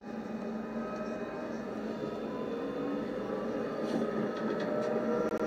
Sound effects > Vehicles
tram sounds emmanuel 10
23, line